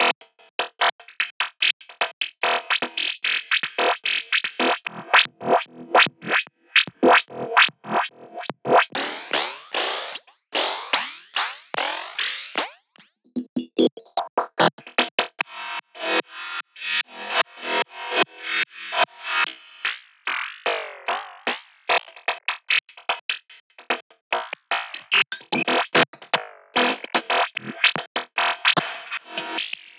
Sound effects > Electronic / Design
An FM synthesizer sample — twisted, distorted, and shredded into thin, screeching, splattering tones — perfect for wild resampling. Ideal for hitech, nightpsy, and forest psytrance, delivering razor-sharp energy and chaotic texture. The BPM and root key in the original project: 147 BPM – E major – but heavily distorted, so results may vary!
loop, psy-tramce, synth, fm, hitech, noise, glitch